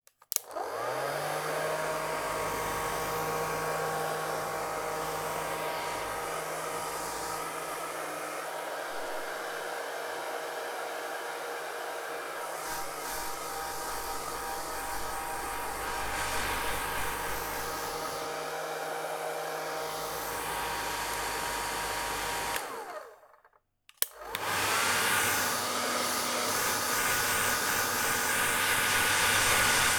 Sound effects > Objects / House appliances
Hairdryer Stereo
Close-recorded hairdryer operating at medium power. This is a demo from the full "Apartment Foley Sound Pack Vol. 1", which contains 60 core sounds and over 300 variations. Perfect for any project genre.